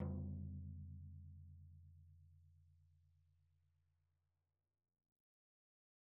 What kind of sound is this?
Music > Solo percussion
Floor Tom Oneshot -008 - 16 by 16 inch
studio, beat, beatloop, drumkit, tom, fill, acoustic, drums, tomdrum, velocity, rim, percs, floortom, oneshot, drum, kit, beats, flam, percussion, perc, instrument, rimshot, roll, toms